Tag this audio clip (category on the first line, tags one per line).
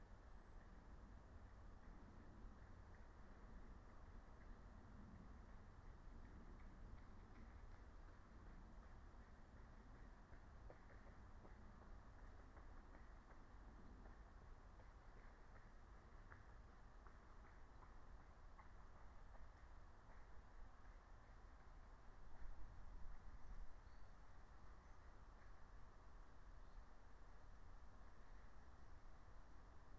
Soundscapes > Nature
meadow
soundscape
phenological-recording
raspberry-pi
nature
alice-holt-forest
natural-soundscape
field-recording